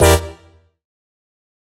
Sound effects > Electronic / Design
a sound that might play when experiencing an error message, or perhaps an incorrect guess in a quiz game. this was originally made for a song of mine back in 2022